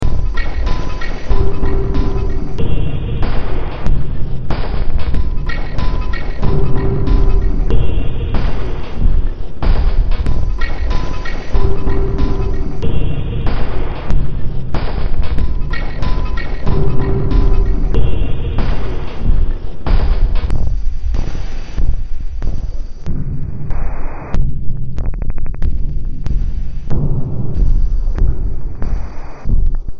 Music > Multiple instruments
Demo Track #3101 (Industraumatic)
Underground, Industrial, Cyberpunk, Noise, Soundtrack, Horror, Ambient, Sci-fi, Games